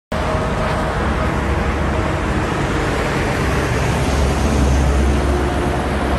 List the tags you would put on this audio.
Sound effects > Vehicles
car highway road

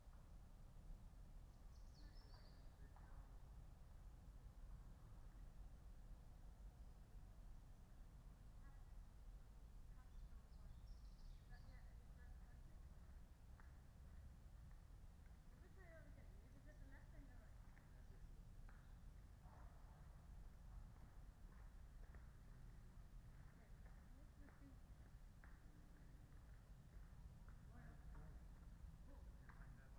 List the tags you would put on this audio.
Soundscapes > Nature
artistic-intervention Dendrophone field-recording modified-soundscape natural-soundscape nature phenological-recording raspberry-pi sound-installation weather-data

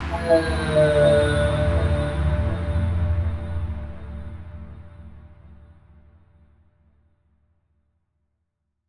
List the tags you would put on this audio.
Sound effects > Electronic / Design
sfx bad

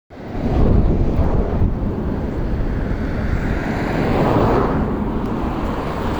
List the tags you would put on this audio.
Sound effects > Vehicles
vehicle traffic car

